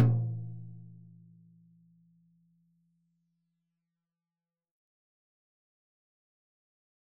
Music > Solo percussion
Med-low Tom - Oneshot 2 12 inch Sonor Force 3007 Maple Rack
acoustic, beat, drum, drumkit, drums, flam, kit, loop, maple, Medium-Tom, med-tom, oneshot, perc, percussion, quality, real, realdrum, recording, roll, Tom, tomdrum, toms, wood